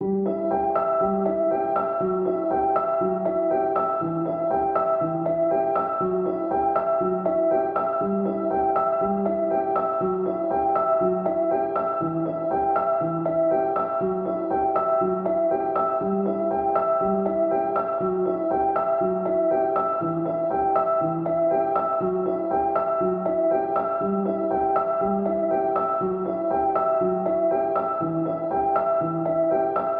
Music > Solo instrument
Piano loops 130 efect 4 octave long loop 120 bpm
120, 120bpm, free, loop, music, piano, pianomusic, reverb, samples, simple, simplesamples